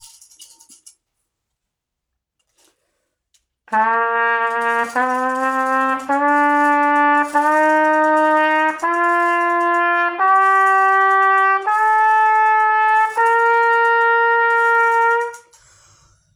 Instrument samples > Wind
trumpet warmup
This is a sound clip of me warming up my trumpet with a concert B-flat scale
Trumpet, warm-up, scale, concert, musical, B-flat